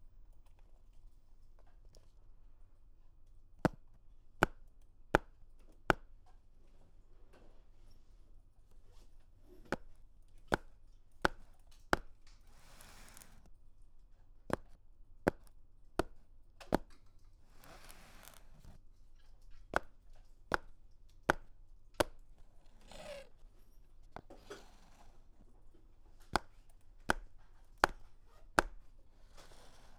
Sound effects > Objects / House appliances
Sound of chopping on cutting board and moving chopped items aside at the end.
soundeffect, soundscape, effect